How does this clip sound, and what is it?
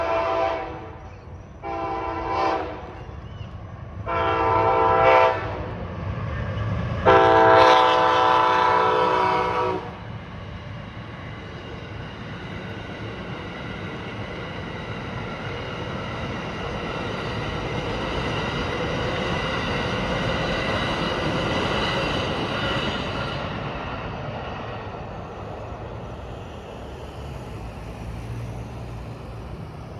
Sound effects > Vehicles
Train Sound 2025/12/01
Train sound, recorded 2025/12/01 on an iPhone 12 Mini I didn't catch the approach. One of these days I might try to go out there and wait with my mic ready to get a full recording, it's such a cool haunting urban sound
honk, horn, train, railroad